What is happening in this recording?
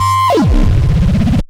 Instrument samples > Synths / Electronic
CVLT BASS 170
bass, bassdrop, drops, low, stabs, sub, subbass, subwoofer, synth, synthbass, wavetable, wobble